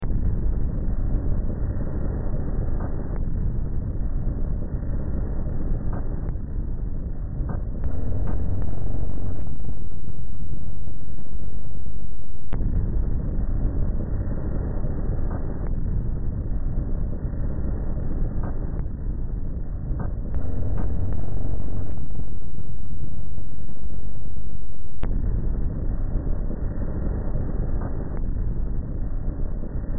Music > Multiple instruments
Demo Track #3419 (Industraumatic)
Ambient, Cyberpunk, Games, Horror, Industrial, Noise, Sci-fi, Soundtrack, Underground